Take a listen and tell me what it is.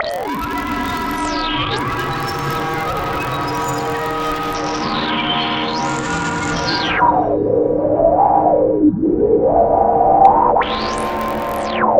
Sound effects > Electronic / Design
Roil Down The Drain 9
dark-techno, sci-fi, science-fiction, drowning, sound-design, noise-ambient, content-creator, vst, cinematic, scifi, noise, horror, mystery, dark-design, dark-soundscapes, PPG-Wave